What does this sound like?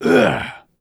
Sound effects > Human sounds and actions
Man in Pain
Guy + emotional distress. Male vocal recorded using Shure SM7B → Triton FetHead → UR22C → Audacity → RX → Audacity.
interjection, ouch, scream, groan, pain, hurt, emotional, emotion, voice, male, vocal, moan, masculine, man, painful, screaming, shouting, yell